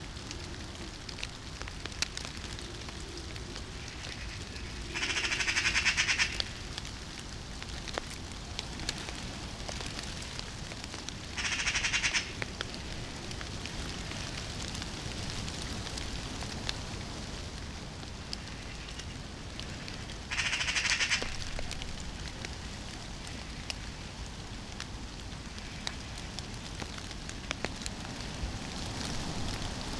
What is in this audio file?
Soundscapes > Nature
Magpies in the Rain
Eurasian magpies calling in the rain recorded in a suburban setting. There are gusts of wind and, occasionally, these cause some wind noise across the mics. Recorded with a Zoom H1essential and Earsight microphones in ORTF arrangement.